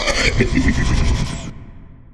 Sound effects > Experimental
A collection of alien creature monster sounds made from my voice and some effects processing